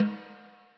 Music > Solo percussion
Snare Processed - Oneshot 157 - 14 by 6.5 inch Brass Ludwig
hit, sfx, drum, realdrums, rimshot, acoustic, drumkit, roll, hits, ludwig, realdrum, snareroll, oneshot, rim, processed, rimshots, kit, brass, snare, snares, reverb, percussion, perc, fx, crack, flam, snaredrum, beat, drums